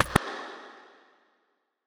Sound effects > Experimental
snap crack perc fx with verb -glitchid 0013
edm, alien, sfx, fx, zap, whizz, otherworldy, hiphop, perc, impacts, abstract, laser, pop, idm, experimental, lazer, crack, percussion, snap, impact, clap, glitch, glitchy